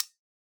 Sound effects > Other mechanisms, engines, machines
Upside-down circuit breaker switch-004
foley, percusive, sampling, recording, click